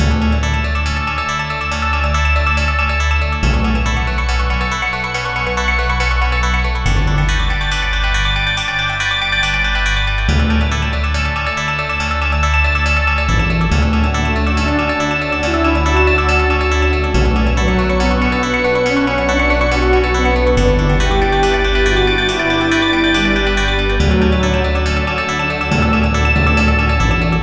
Music > Multiple instruments
Intense Rush Loop 2 (16 Bars)
A lot of effort and time goes into making these sounds. 16 bars long at 140bpm, starting in D major. I was thinking of someone experiencing joy as they soar through the air in a glider or some other flying machine. Or maybe it's best used for when a character becomes invincible in a platformer?
140-bpm-16-bar-loop, 140bpm-loop, 16-bar-loop, audio-loop, clean-loop, d-major-loop, exhilirating-loop, exihilirating, exihiliration, intense-loop, musical-loop, music-loop, muted-guitar-loop, silver-illusionst-music